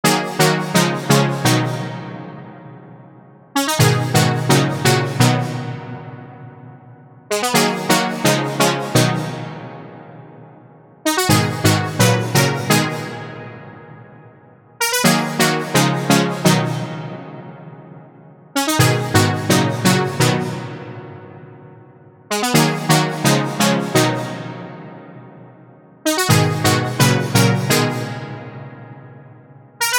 Instrument samples > Synths / Electronic
Ableton Live.Simple VST.Fury-800......Melody 128 bpm Free Music Slap House Dance EDM Loop Electro Clap Drums Kick Drum Snare Bass Dance Club Psytrance Drumroll Trance Sample .
128, bpm